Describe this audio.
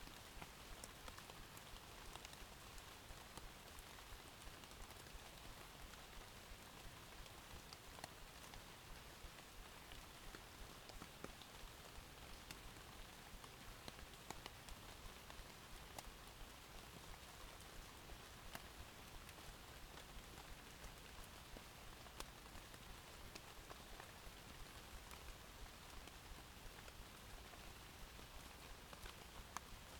Soundscapes > Nature
2025 09 08 05h00 Gergueil in combe de poisot - Vorest valley ambience
Subject : Ambience recording of the forest. Inside a "combe" (bottom of valley) forest. Microphone was under a bolder/rock with a tree ontop a few meters from the path. Date YMD : 2025 September 08, 05h00 Location : Gergueil 21410 Bourgogne-Franche-Comté Côte-d'Or France. Hardware : Zoom H2n XY mode. Small rig magic arm. Weather : Rain. Processing : Trimmed and normalised in Audacity. Zoom was set at 6gain, added a little in post. Notes : Nothing of significance, I don't hear many animals sadly. There is a thunderstorm and heavy rain in the recording.
ambience, 21410, combe, downpour, H2n, Tascam, Gergueil, rain, valley, nature, ambiance, Zoom, night, forest, field-recording, France, country-side, XY, Cote-dor, rural